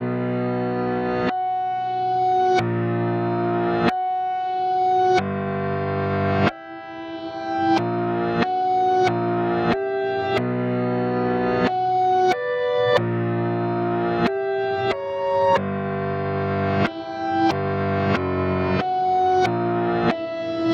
Music > Solo instrument
REVERSE PIANO ONE
reverse, piano